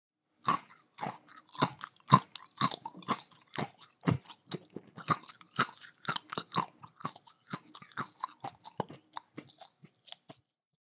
Sound effects > Other
FOODEat Cinematis RandomFoleyVol2 CrunchyBites SaltySticksBite OpenMouth NormalChew Freebie
crunch, food, SFX, snack